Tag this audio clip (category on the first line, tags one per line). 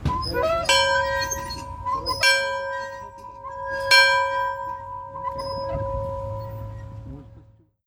Sound effects > Objects / House appliances
hung
ring
rope